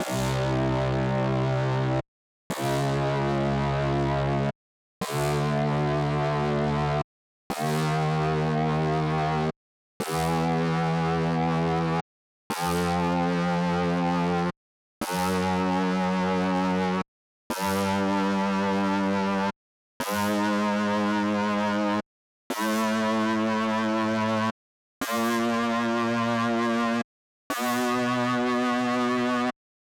Music > Solo instrument
Lead made from UAD Opal synth
lead, osc, synth